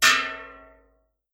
Sound effects > Objects / House appliances
METLImpt-Samsung Galaxy Smartphone, CU Pot, Full Of Water, Bang Nicholas Judy TDC
A pot full of water bang.
full, water, waterfilled